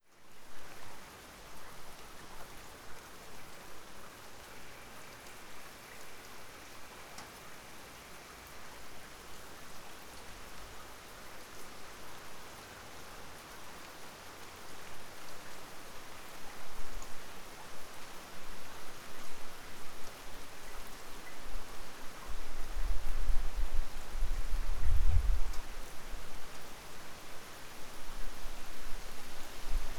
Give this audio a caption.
Soundscapes > Nature
Rain at the House of Writers in Tarusa, Kaluga Region
wind, thunder, storm, nature, weather, rain, field-recording